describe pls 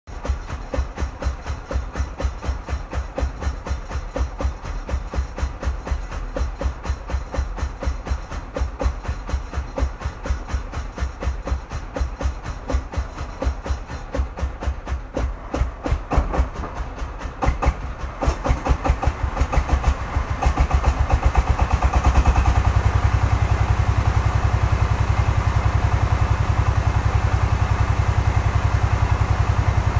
Sound effects > Other mechanisms, engines, machines
Động Cơ 8
Engine for big wood saw. Record use iPhone 7 Plus 2025.05.28 15:32
gasoline, engine, motor, piston